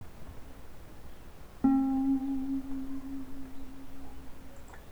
Instrument samples > String
Vibrato guitar
Guitar vibrato i recorded.
Guitar, Nylon, string